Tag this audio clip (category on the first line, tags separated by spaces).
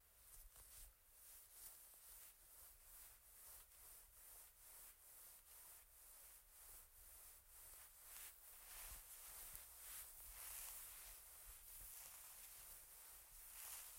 Sound effects > Experimental
experimental noise weird